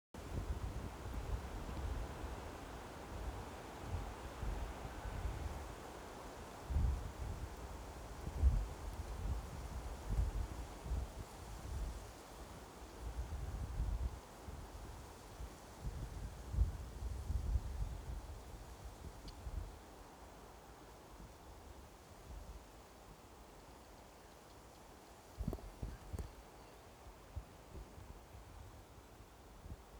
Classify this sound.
Soundscapes > Nature